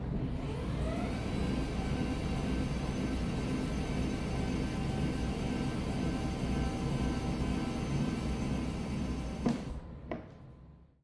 Sound effects > Other mechanisms, engines, machines
Hydraulics Moving
Recorded the sound of an adjustable desk that I bought recently using my laptop. Lasts 9.5 seconds before finishing with a thud.